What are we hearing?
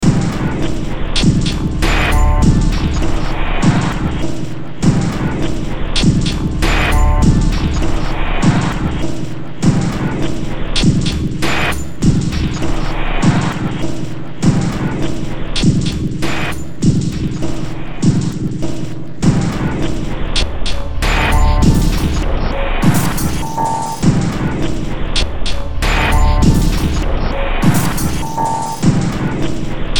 Music > Multiple instruments
Demo Track #3850 (Industraumatic)
Horror, Industrial